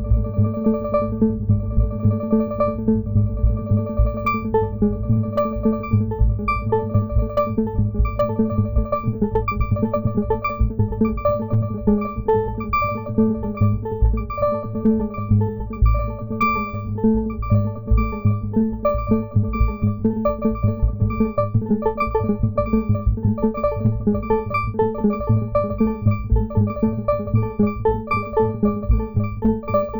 Music > Solo instrument
Brilliant Texture out of FM Bells Dings #001
Brilliant texture made out of FM modulated bells produced with the Soma Terra
bell, chime, brilliant, FM, ding, texture